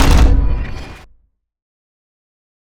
Sound effects > Other
Sound Design Elements Impact SFX PS 108
All samples used in the production of this sound effect are field recordings recorded by me. Recording gear-Tascam Portacapture x8 and Microphone - RØDE NTG5.The samples of various types of impacts recorded by me were layered in Native Instruments Kontakt 8, then the final audio processing was done in REAPER DAW.
audio, blunt, cinematic, collision, crash, design, effects, explosion, force, game, hard, heavy, hit, impact, percussive, power, rumble, sfx, sharp, shockwave, smash, sound, strike, thudbang, transient